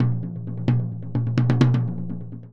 Music > Solo percussion
Med-low Tom - Oneshot 24 12 inch Sonor Force 3007 Maple Rack
percussion, drum, perc, toms, med-tom, kit, maple, beat